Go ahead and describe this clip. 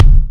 Instrument samples > Percussion
kick loudfat 1
The original file is superior. ______ It has a clicky attack and a deep bass sustain. It is an interesting kick. I will create more bass drums/ kicks from it.
attack, bass, bass-drum, bassdrum, beat, death-metal, drum, drums, fat-drum, fatdrum, fat-kick, fatkick, forcekick, groovy, headsound, headwave, hit, kick, mainkick, metal, natural, Pearl, percussion, percussive, pop, rhythm, rock, thrash, thrash-metal, trigger